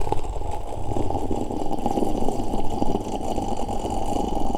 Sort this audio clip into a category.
Sound effects > Objects / House appliances